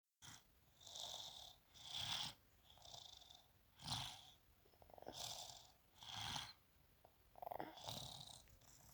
Sound effects > Human sounds and actions
#0:02 snore